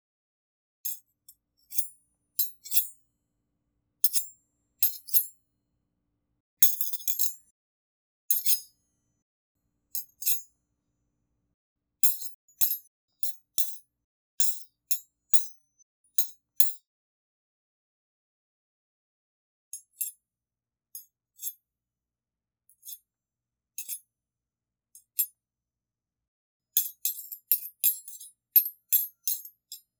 Sound effects > Objects / House appliances
fork hits ufotable sword hit battle sound 06282025
recordings of silverware fork hitting silverware fork (original for the first half, and low speed on teh 2nd and final half.)
attack, battle, blade, combat, dagger, duel, fight, fighting, fork, karate, katana, knight, kung-fu, light, martialarts, medieval, melee, psai, sai, silverware, small, sword, weapon, weapons, yasomasa